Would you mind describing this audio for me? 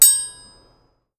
Music > Solo percussion
MUSCTnprc-Blue Snowball Microphone, CU Triangle Ring Nicholas Judy TDC
A triangle ringing.